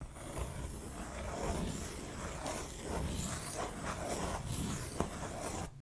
Sound effects > Objects / House appliances
Pencil stroke accelerate slow
Pencil scribbles/draws/writes/strokes slowly accelerates.
pencil, write, draw